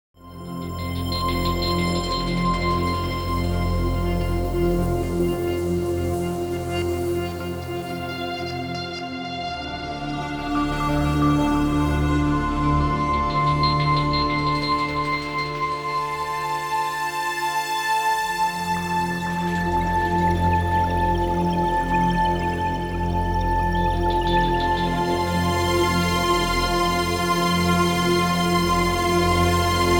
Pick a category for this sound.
Soundscapes > Synthetic / Artificial